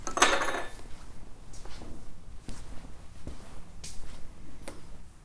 Sound effects > Other mechanisms, engines, machines
metal shop foley -235
fx, bam, perc, crackle, pop, boom, tink, bang, little, thud, foley, knock, sfx, shop, metal, oneshot, bop, wood